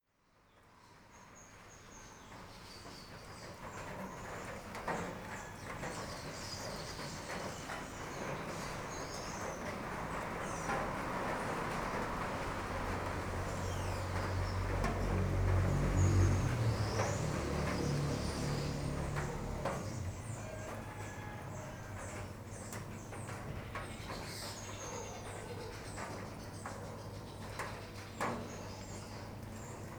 Soundscapes > Nature
013A 091105 0015 MAUR Rainy morning in Mauritius
Rainy morning atmosphere in Mauritius. This recording has been made at about 6:45AM, under the metallic roof of a shed,in the courtyard of a hotel located in Pointe-aux-Piments, Pamplemousse district, Mauritius. One can hear birds, including weavers and mynah-birds, vehicles passing by on the nearby road (mopeds, cars and trucks), as well as some voices and noise from the hotel staff while they’re making breakfast ready. Recorded in March 2025 with a Yamaha Pocketrak C24. Fade in/out applied in Audacity. Please note that this audio file has been kindly recorded by Dominique LUCE, who is a photographer.
birds, cars, courtyard, drops, mynah, rain